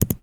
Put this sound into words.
Sound effects > Objects / House appliances
MBA R key mono
Subject : A keypress from a Macbook Air M2 Keyboard. Date YMD : 2025 03 29 Location : Saint-Assiscle, South of France. Hardware : Zoom H2N, MS mode. Weather : Processing : Trimmed and Normalized in Audacity.
Zoom-H2N, Keyboard, Close-up, Zoom-Brand, Key, individual-key, key-press, H2N